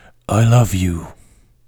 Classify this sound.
Speech > Solo speech